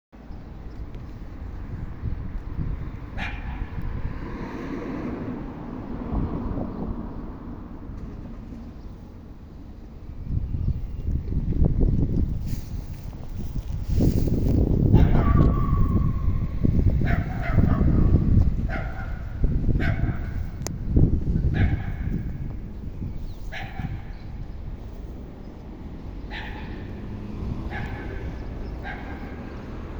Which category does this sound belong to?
Soundscapes > Urban